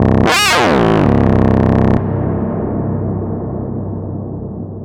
Instrument samples > Synths / Electronic
CVLT BASS 10
drops, wobble, synthbass, lowend, wavetable, clear, lfo, bassdrop, subbass, low, bass, sub, subwoofer, synth, stabs, subs